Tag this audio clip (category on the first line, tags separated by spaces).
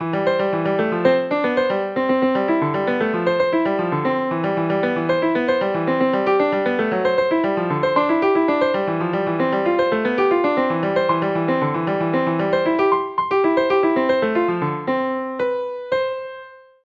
Music > Other
cream ice melody music truck